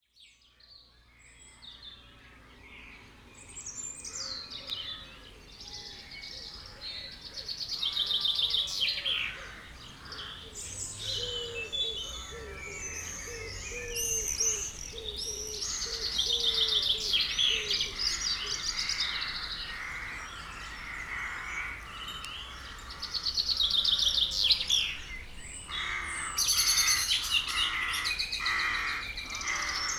Soundscapes > Nature
An evening recording in a garden of a B&B in the Lake District.
birds, field, ambience, garden, recording, wind, gentle, sheep